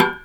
Sound effects > Other mechanisms, engines, machines
Handsaw Oneshot Hit Stab Metal Foley 22
foley, fx, handsaw, hit, household, metal, metallic, perc, percussion, plank, saw, sfx, shop, smack, tool, twang, twangy, vibe, vibration